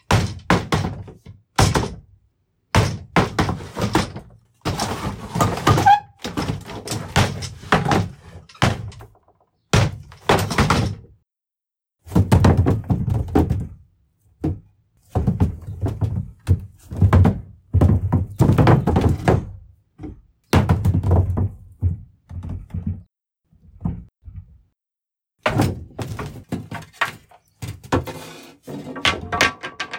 Sound effects > Other
A mess is moved, pulled, and thrown roughly across a wooden floor. It includes various textures: furniture, chairs, rolled barrels, planks, and wood panels. I needed these sound effects to create chaos inside a ship. This one focuses on wooden elements, but you'll also find wicker in another audio, brooms, metalic elements, cardboard boxes, and barrels. * No background noise. * No reverb nor echo. * Clean sound, close range. Recorded with Iphone or Thomann micro t.bone SC 420.